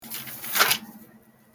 Sound effects > Objects / House appliances
Me turning a page of a 1-Subject Notebook Pen + Gear Page. Recorded with audiomass
book, flip, notebook, page, turn